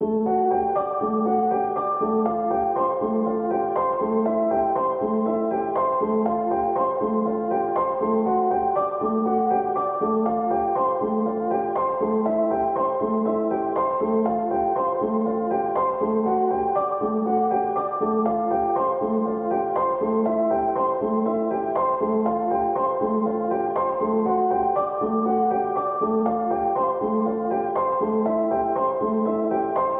Music > Solo instrument
Piano loops 129 efect 4 octave long loop 120 bpm
120, 120bpm, loop, music, piano, pianomusic, reverb, samples, simple, simplesamples